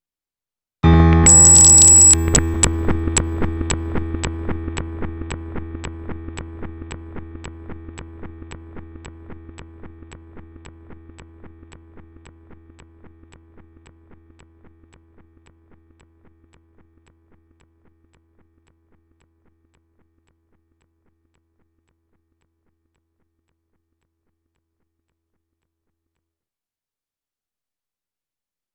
Instrument samples > Piano / Keyboard instruments
Broken Yamaha E-Piano E
Broken Yamaha electric piano with tone E going crazy glitching.
broken, malfunction, Yamaha